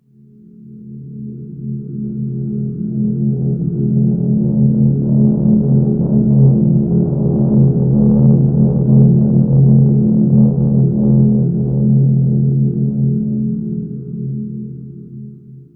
Sound effects > Electronic / Design
Sky glass drone v1
"Creepy musical glass" from xkeril, pitch-shifted, reversed and looped using the EHX 22500 dual loop pedal, with additional pitch shifting from EHX Pitchfork and sampled using Chase Bliss Onward. Resulting into a rather celestial short drone pad.
ambient, artificial, drone, experimental, glass, soundscape